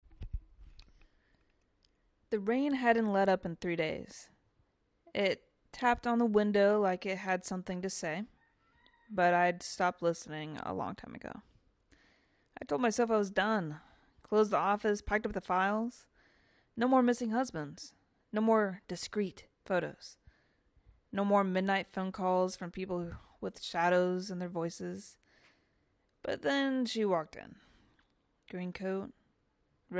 Speech > Solo speech
“One Last Case” (noir / mystery / reflective monologue)
A moody noir character piece full of rain-soaked tension and mystery. Ideal for voice acting practice, audio drama intros, or stylistic narration. Script: The rain hadn’t let up in three days. It tapped on the windows like it had something to say—but I’d stopped listening a long time ago. I told myself I was done. Closed the office. Packed up the files. No more missing husbands. No more “discreet” photos. No more midnight phone calls from people with shadows in their voices. But then she walked in. Green coat. Red lipstick. Eyes like she hadn’t slept in a decade. “You don’t know me,” she said, “but you knew my brother. He trusted you.” I shouldn’t’ve asked. I should’ve told her I was out of the game. But I didn’t. Because the thing is… I did know her brother. And I still had blood on my hands.
Script, noirstory, detectivemonologue, voiceover